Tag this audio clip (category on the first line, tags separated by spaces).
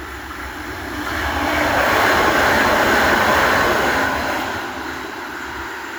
Soundscapes > Urban
field-recording Tram